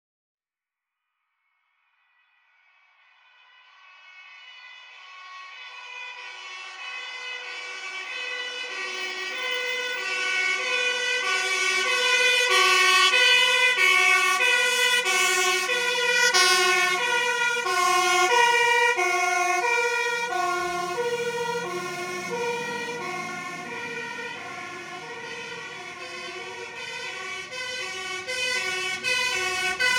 Sound effects > Vehicles
Multiple firetrucks were rushing to a vehicle that was emitting smoke inside of a parking garage. I recorded two trucks passing right in front of me while I was standing at an intersection. You can hear that the first truck has a slower siren than the second one. A few things surprised me about this recording: 1. The iPhone's Voice Memo app clearly has a really solid limiter built in, that kept the very loud siren sound from clipping. 2. Perhaps less surprising, but not a lot of bass of the passing trucks and cars was captured. So I got the idea, why not isolate the sirens even more?